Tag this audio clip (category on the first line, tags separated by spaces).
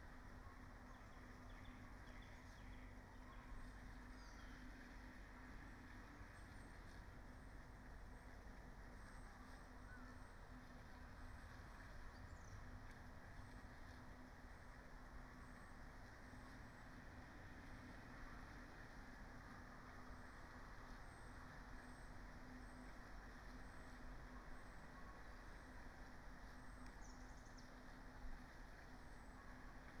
Soundscapes > Nature
nature artistic-intervention alice-holt-forest soundscape data-to-sound phenological-recording weather-data raspberry-pi sound-installation natural-soundscape modified-soundscape Dendrophone field-recording